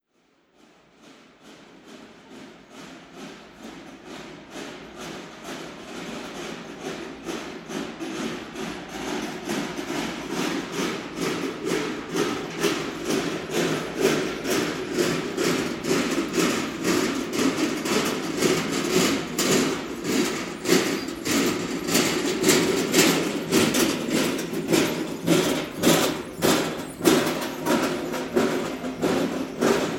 Sound effects > Objects / House appliances
OBJWhled-Samsung Galaxy Smartphone, CU Big Cart By 01 Nicholas Judy TDC
A big cart passing by. Recorded at Lowe's.
pass-by, big, Phone-recording, cart